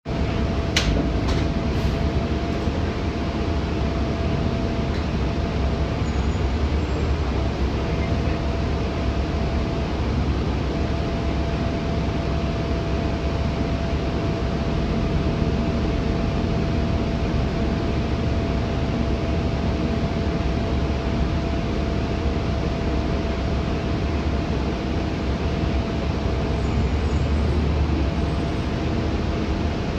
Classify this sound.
Soundscapes > Urban